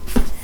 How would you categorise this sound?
Sound effects > Other mechanisms, engines, machines